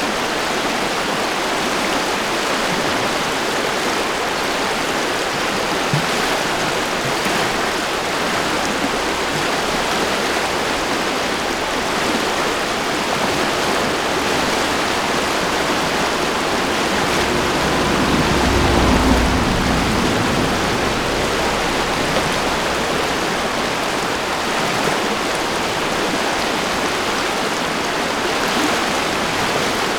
Sound effects > Natural elements and explosions
250710 20h21 Esperaza Bridge D46 Upstream MKE600
Subject : Recording the river from the D46 bridge. Looking upstream. Sennheiser MKE600 with stock windcover P48, no filter. Weather : Processing : Trimmed in Audacity.
11260, 2025, Aude, FR-AV2, Hypercardioid, July, MKE600, river, Shotgun-mic, Shotgun-microphone, Upstream, water